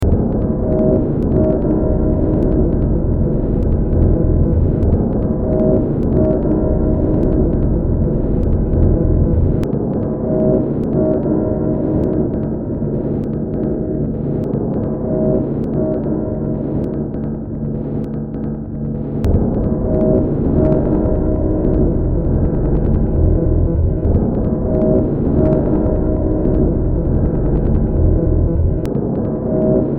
Music > Multiple instruments
Demo Track #3478 (Industraumatic)
Track taken from the Industraumatic Project.
Ambient, Games, Horror, Noise, Industrial, Underground, Sci-fi, Soundtrack, Cyberpunk